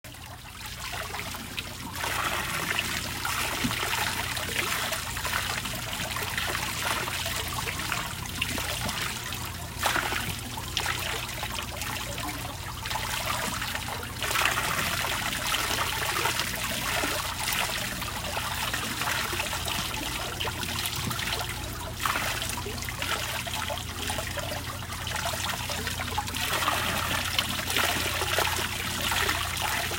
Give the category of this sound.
Sound effects > Natural elements and explosions